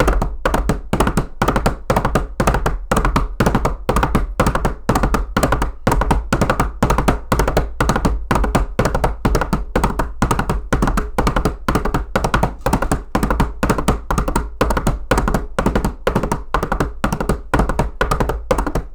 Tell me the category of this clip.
Sound effects > Animals